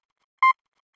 Sound effects > Electronic / Design
A series of beeps that denote the letter E in Morse code. Created using computerized beeps, a short and long one, in Adobe Audition for the purposes of free use.